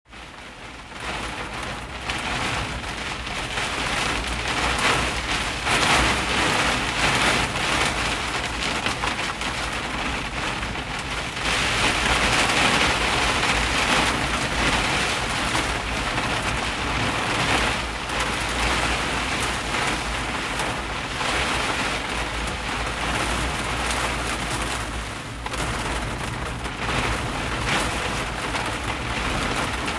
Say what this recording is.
Sound effects > Natural elements and explosions
Hard Rain on Jeep Roof - Interior
Hard rain on roof of Jeep Wrangler. Interior recording.
rain, raindrops, raining, vehicle, weather